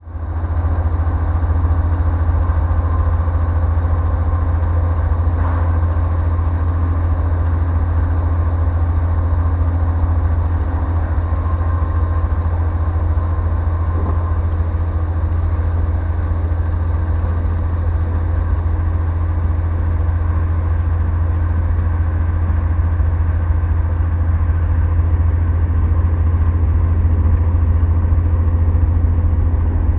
Sound effects > Other mechanisms, engines, machines
A recording of the inside of a train from a recent trip to London using a contact mic.
rumble, train, recording, mic, field, contact